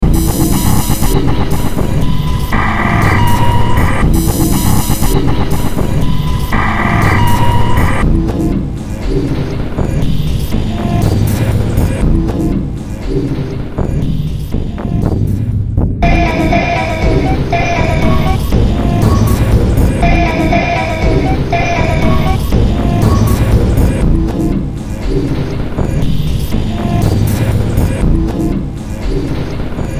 Music > Multiple instruments
Demo Track #3342 (Industraumatic)
Industrial, Noise, Games, Soundtrack, Cyberpunk, Underground, Sci-fi, Ambient, Horror